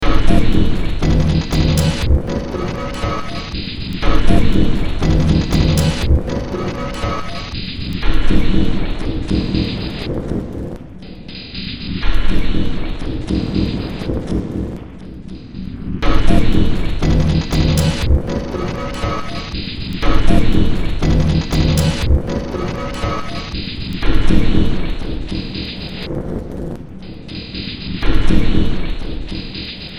Music > Multiple instruments
Demo Track #3714 (Industraumatic)
Underground, Games, Industrial, Soundtrack, Cyberpunk, Sci-fi, Noise, Horror, Ambient